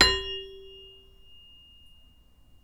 Sound effects > Other mechanisms, engines, machines
metal shop foley -052
bang, boom, bop, crackle, knock, oneshot, pop, rustle, shop, strike, thud, tink, tools, wood